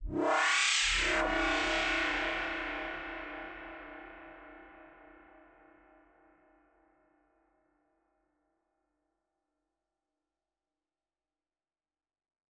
Sound effects > Other
Neural Omelette
Generic brain frying wave. Made with FL Studio.